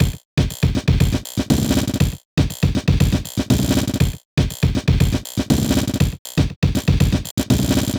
Music > Solo percussion
glitchy drums sample
I made a free drum preset in pandorasbox using custom audio buffers. I hope this sample can be useful to you.